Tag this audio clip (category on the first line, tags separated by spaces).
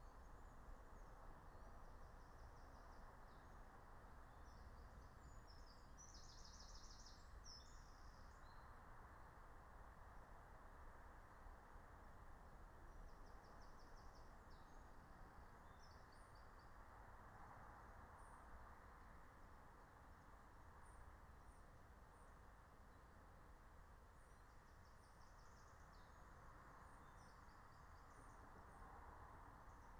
Soundscapes > Nature
natural-soundscape soundscape nature phenological-recording alice-holt-forest field-recording meadow raspberry-pi